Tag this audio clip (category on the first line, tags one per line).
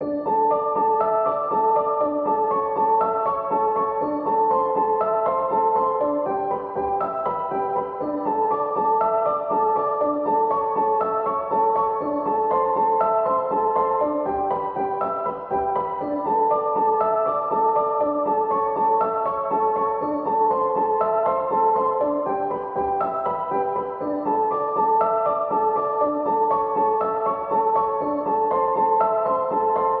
Music > Solo instrument
120
120bpm
free
loop
music
piano
reverb
simple
simplesamples